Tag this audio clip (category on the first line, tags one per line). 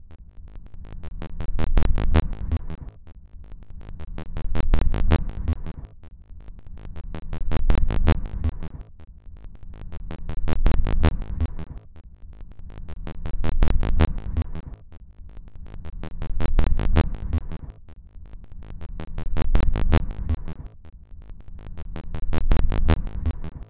Instrument samples > Percussion
Underground
Weird
Loop
Ambient
Industrial
Soundtrack
Loopable
Drum
Packs
Samples
Alien
Dark